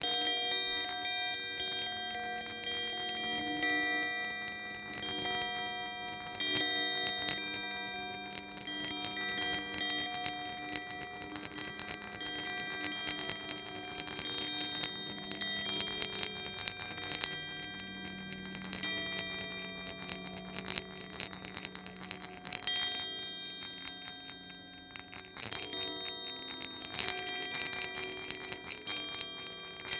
Instrument samples > Other
Baoding Balls - High - 02 (Long)
Closely miked recording of Chinese Baoding Balls made in 2018.
balls, closerecording